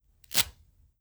Sound effects > Objects / House appliances
Undoing/releasing a velcro strap on dog coat. Made by R&B Sound Bites if you ever feel like crediting me ever for any of my sounds you use. Good to use for Indie game making or movie making. This will help me know what you like and what to work on. Get Creative!
coat, dog, releasing, strap, undoing, velcro